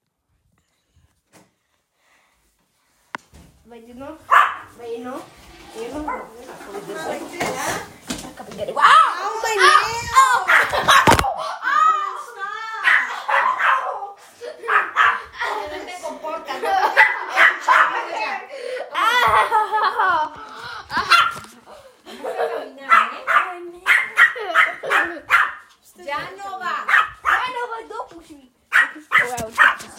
Sound effects > Animals
Intense arguments & puppy barks
ADHD kid playing with the dog while the parents stop him for being too hyper. This was recorded 2 years ago.
Barking, Intense, Shake